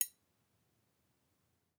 Sound effects > Other mechanisms, engines, machines
Spanner Click 04
click, garage